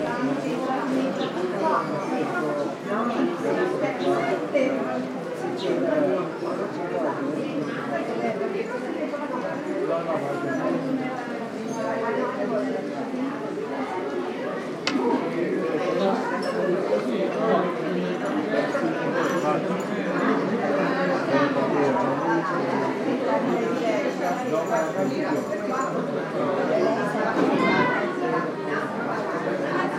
Soundscapes > Urban
Field recording taken in the square of a small mountain village in the Maritime Alps in Italy, at 10 a.m., when the few people present are shopping in the shop and having breakfast at the bar. In the background, the sound of coffee cups and glasses, people talking, the trickling of a fountain, birds (Italian sparrows, swallows) and the slow flow of quiet life.